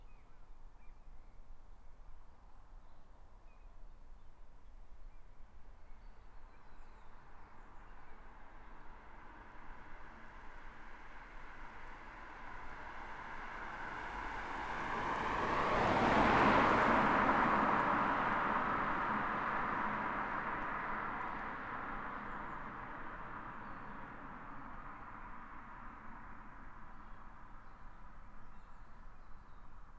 Soundscapes > Urban
AMBSubn Hammering and birds in a calm residential area, in the evening, Lyckeby, Sweden
Recorded 18:44 08/04/25 The microphone is in a green area with trees along a three-way intersection. A few cars pass on the right, and in the distance you can hear a highway. A few houses away a person hammers in the first half of the recording. Otherwise it’s quite calm with birds such as robins, magpies, and a consistent blackbird. Zoom H5 recorder, track length cut otherwise unedited.